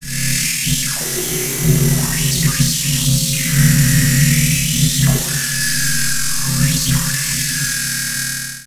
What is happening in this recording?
Sound effects > Electronic / Design
Structural Droidscape
Abstract, Alien, Analog, Automata, Buzz, Creature, Creatures, Digital, Droid, Drone, Experimental, FX, Glitch, Mechanical, Neurosis, Noise, Otherworldly, Robotic, Spacey, Synthesis, Trippin, Trippy